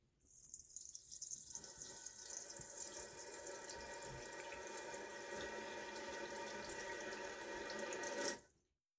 Sound effects > Human sounds and actions
Someone urinating in a toilet.